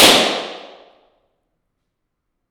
Soundscapes > Urban
aggressive balloon pop